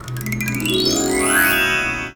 Sound effects > Electronic / Design
Cliche Magic Spell Sound
Cliché magic spell sound effect.
magician, bells, magical, wizard, magic, transition, sparkly, chimes, effect, spellcasting, simple, spellcaster, cute, sparkle, chime, fairy, spell, cartoon